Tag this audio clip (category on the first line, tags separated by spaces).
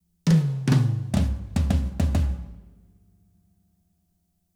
Music > Solo percussion
pop toms drum-loop Drums roomy rock retro 80s Fill drum-fill acoustic drumloop indie fill-in 105bpm loop natural-sound